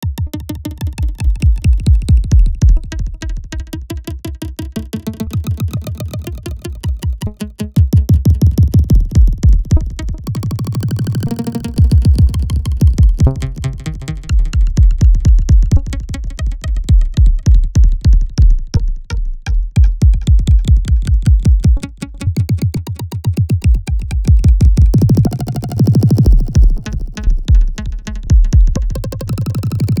Instrument samples > Synths / Electronic
Texture from a kick sample
Texture made out of a sample of kick drum (from the Digitakt 2 default library)
kick, combo